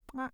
Sound effects > Other
Fail game
mistake, error